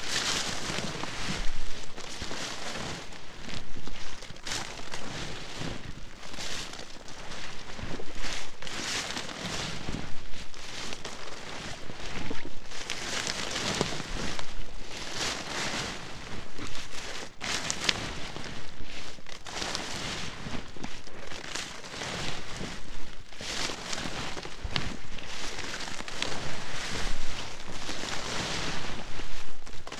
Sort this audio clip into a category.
Sound effects > Human sounds and actions